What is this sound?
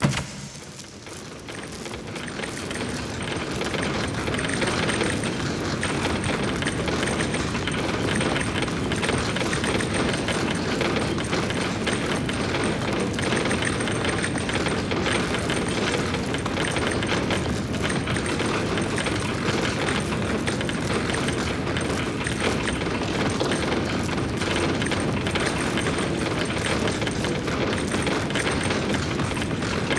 Sound effects > Other mechanisms, engines, machines
Heavy Chain&Gears Moving2(Reverbed)
Hi! That's not recordedsound :) I synthed it with phasephant!